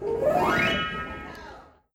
Music > Solo instrument
A high grand piano gliss up. Recorded at The Arc.